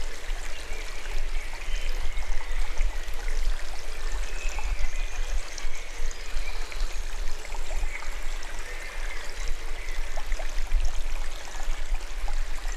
Soundscapes > Nature
Bach-im-Schwarzwald
Kleiner Bachlauf mitten im Schwarzwald in der Nähe von Kandern Small stream in the middle of the Black Forest near Kandern
Bach, Schwarzwald, Voegel